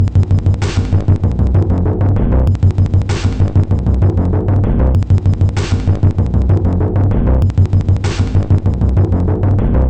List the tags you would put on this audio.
Instrument samples > Percussion
Alien Underground Loopable Drum Packs Loop Samples Industrial Dark Soundtrack Weird Ambient

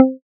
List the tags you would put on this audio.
Instrument samples > Synths / Electronic
additive-synthesis pluck fm-synthesis

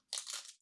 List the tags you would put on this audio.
Instrument samples > Percussion
adhesive ambient cellotape cinematic creative design DIY drum electronic experimental foley found glitch IDM layering lo-fi one organic pack percussion sample samples shot shots sound sounds tape texture unique

